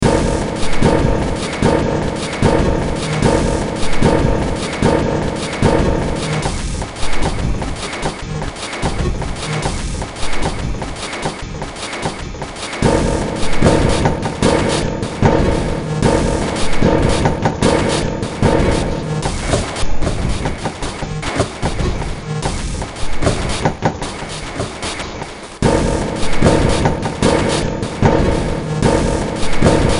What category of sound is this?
Music > Multiple instruments